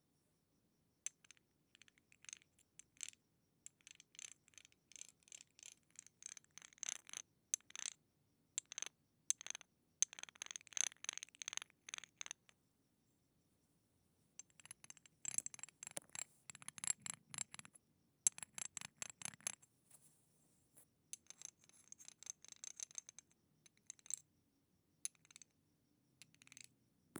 Sound effects > Experimental
GLASSMisc Gua sha roller sliding glass guasha rocky way NMRV FSC2
glass sound with a guasha glass and rolling it, rocky sound while rolling